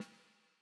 Music > Solo percussion
Snare Processed - Oneshot 11 - 14 by 6.5 inch Brass Ludwig
crack, oneshot, realdrums, reverb, rimshots, snareroll